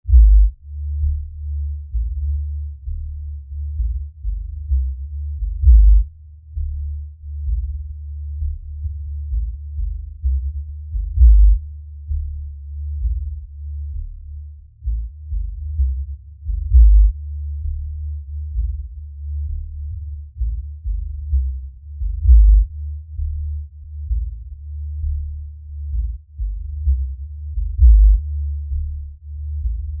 Music > Solo instrument
Ableton Live. VST........Fury-800......Sub 130 bpm Free Music Slap House Dance EDM Loop Electro Clap Drums Kick Drum Snare Bass Dance Club Psytrance Drumroll Trance Sample .